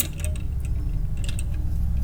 Sound effects > Other mechanisms, engines, machines
Woodshop Foley-012
bam, bang, boom, bop, crackle, foley, fx, knock, little, metal, oneshot, perc, percussion, pop, rustle, sfx, shop, sound, strike, thud, tink, tools, wood